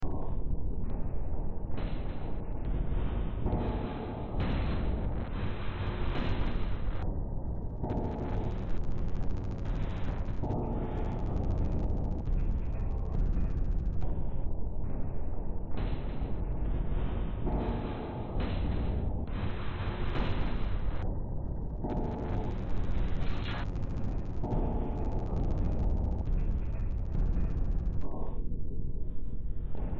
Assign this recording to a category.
Music > Multiple instruments